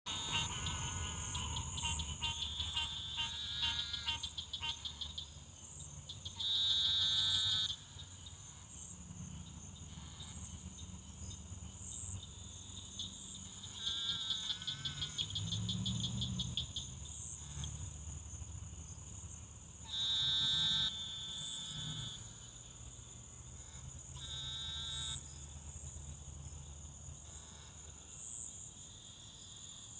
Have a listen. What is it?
Sound effects > Animals
Frogs - Various; Medium Pond Ambience at Dusk
In this recording from an LG Stylus 2022, three types of frogs can be heard calling, including the American green tree and northern cricket frogs, as well as the eastern narrow-mouth toad.
croak, frogs, amphibian, wetland, croaking, bog